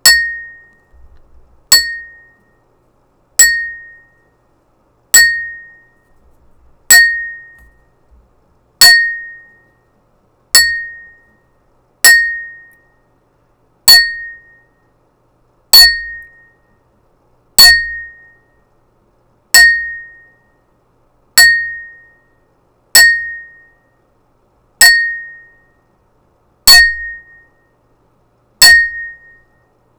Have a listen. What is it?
Sound effects > Objects / House appliances
FOODGware-Blue Snowball Microphone, CU Glass Toasts, Single Dings Nicholas Judy TDC
Single glass toast dings. 'Cheers!'.